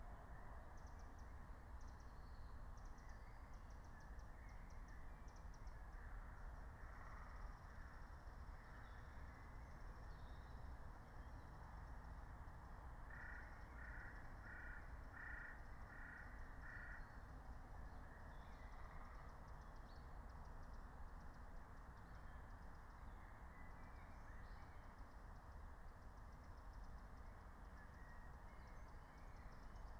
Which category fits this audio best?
Soundscapes > Nature